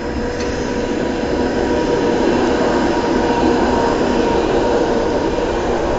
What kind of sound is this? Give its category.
Soundscapes > Urban